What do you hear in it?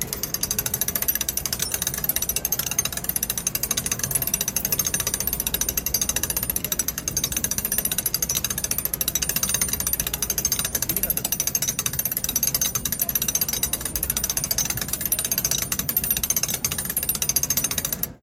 Sound effects > Other mechanisms, engines, machines
MECHGear-Samsung Galaxy Smartphone, CU Two, Cranking Together, Drawbridge Nicholas Judy TDC
Mechanical gears cranking. Useful for a drawbridge. Recorded at Bass Pro Shop.